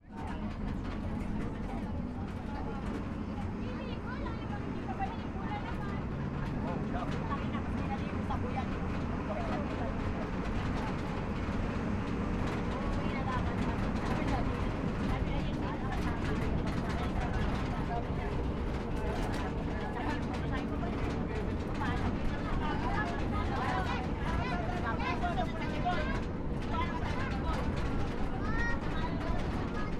Soundscapes > Other
250727 100841 PH Filipino people travelling in a truck trailer
Filipino people travelling in a truck trailer. Here we are with a group of Filipino people (men, women and children) chatting and travelling in the trailer of a big truck (usually used to carry coco nuts or bananas), on the way to a river in which every one will swim and enjoy ! Of course, this is certainly not a regular transportation, and it is not allowed to travel in such a manner, but it might happen, and I didn’t want to miss the opportunity to record such a special trip ! ;-) Recorded in July 2025 with a Zoom H5studio (built-in XY microphones). Fade in/out applied in Audacity.